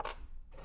Sound effects > Objects / House appliances
knife and metal beam vibrations clicks dings and sfx-041
Beam, Clang, ding, Foley, FX, Klang, Metal, metallic, Perc, SFX, ting, Trippy, Vibrate, Vibration, Wobble